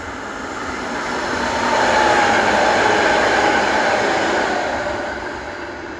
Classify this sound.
Soundscapes > Urban